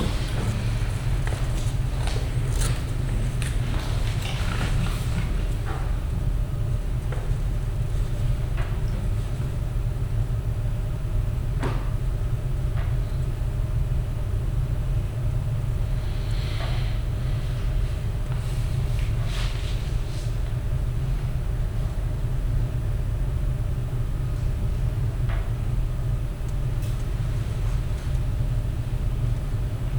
Soundscapes > Indoors
A moment of silence for someone.
A moment of silence for a deceased relative. About 40 people in the room. 2025 09 16 at a crematorium in Dijon.